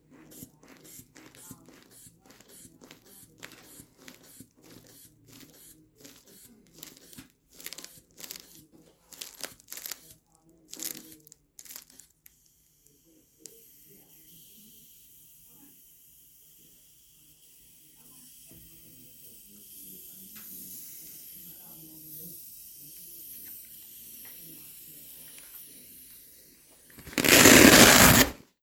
Sound effects > Objects / House appliances
OBJMed-Samsung Galaxy Smartphone, CU Blood Pressure Cuff, Air Pumps, Release, Velcro Rip At End Nicholas Judy TDC
A blood pressure cuff air pumping and releases, then velcro rip at end. Recorded at Cold Harbor Family Medicine.
velcro, end, Phone-recording